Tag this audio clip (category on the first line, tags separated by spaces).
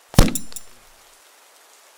Sound effects > Natural elements and explosions
shot gun shooting fire